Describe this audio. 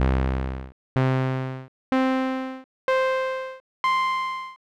Instrument samples > Synths / Electronic
lowpassed saw
made with openmpt
notes, saw, synth